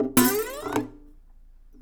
Music > Solo instrument
acoustic guitar slide2
acosutic, chord, chords, dissonant, guitar, instrument, knock, pretty, riff, slap, solo, string, strings, twang